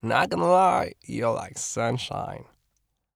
Speech > Solo speech
Surfer dude - Not ganna lie youre like sunshine
Subject : Recording my friend going by OMAT in his van, for a Surfer like voice pack. Date YMD : 2025 August 06 Location : At Vue de tout albi in a van, Albi 81000 Tarn Occitanie France. Shure SM57 with a A2WS windshield. Weather : Sunny and hot, a little windy. Processing : Trimmed, some gain adjustment, tried not to mess too much with it recording to recording. Done inn Audacity. Some fade in/out if a one-shot. Notes : Tips : Script : You’re like… sunshine, not gonna lie.
VA, SM57, Dude, 2025, RAW, August, FR-AV2, Tascam, Surfer, France, Voice-acting, Single-mic-mono, Male, English-language, In-vehicle, Mono, mid-20s, A2WS, Adult, Cardioid, 20s, flirt